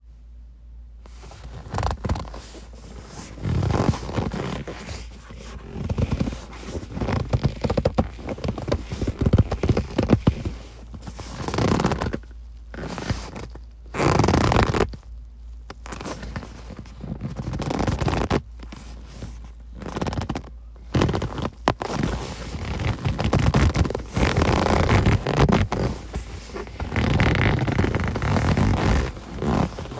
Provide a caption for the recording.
Sound effects > Vehicles
Leather Car Seat Handling
Leather seat in a Jeep Wrangler Sahara. Sound of leather chair being handled, rubbed, stretched, etc.
auto, automobile, car, chair, interior, leather, seat